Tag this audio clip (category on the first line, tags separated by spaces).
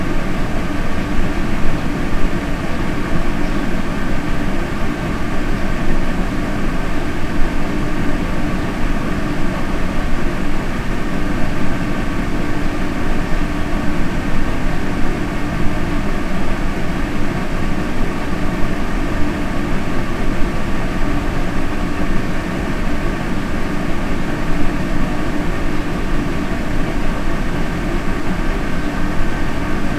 Sound effects > Other mechanisms, engines, machines
2025 81000 air-vent Albi Early Early-morning exhaust France hand-held handheld humm Mono morning Occitanie Outdoor Rode Single-mic-mono Tarn Tascam vent Wind-cover WS8